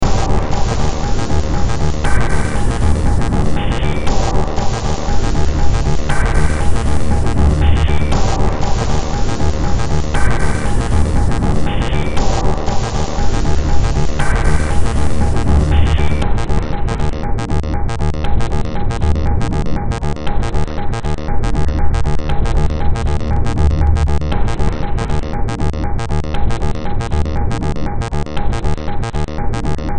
Music > Multiple instruments
Demo Track #3415 (Industraumatic)
Soundtrack, Noise, Horror, Cyberpunk, Sci-fi, Industrial, Ambient, Underground, Games